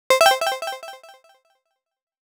Sound effects > Electronic / Design
GAME UI SFX PRACTICE 5
Program : FL Studio, The CS Piky
interface, sound, soundeffect, sfx, game, UI, computer, machine, command